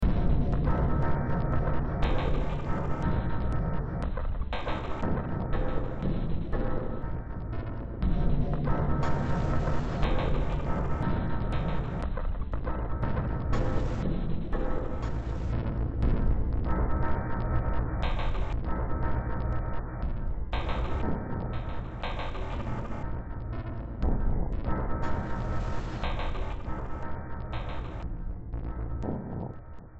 Music > Multiple instruments
Demo Track #3526 (Industraumatic)
Cyberpunk, Industrial, Horror, Ambient, Games, Soundtrack, Noise, Underground, Sci-fi